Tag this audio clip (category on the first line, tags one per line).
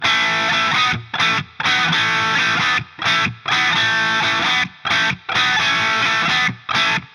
Music > Solo instrument
guitar
metal
rock